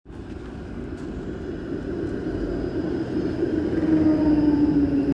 Sound effects > Vehicles
A tram passing by in Tampere, Finland. Recorded on an iPhone 16's built-in microphone. This clip is recorded for the COMP.SGN.120 Introduction to Audio and Speech Processing course project work in Tampere University.